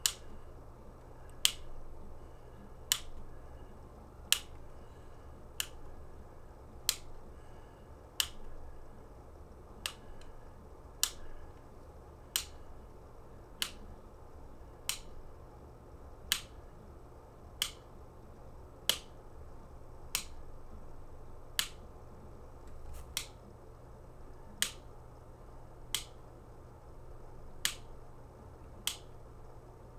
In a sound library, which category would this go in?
Sound effects > Objects / House appliances